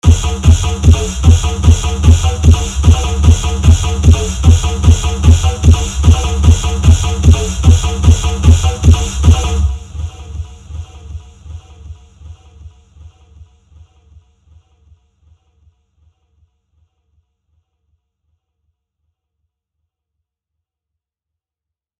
Music > Other
low effort russian song (DONK)
Should you be making a documentary film about Russia or Poland from the hardcore side, use this sound. I will be more than happy to see it